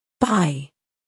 Speech > Solo speech
to buy
english, pronunciation, voice, word